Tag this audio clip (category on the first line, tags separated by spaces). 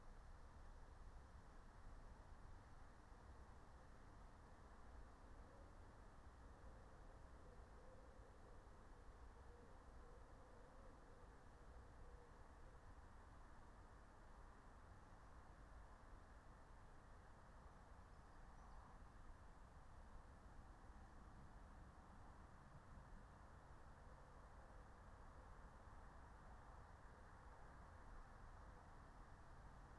Soundscapes > Nature
nature raspberry-pi soundscape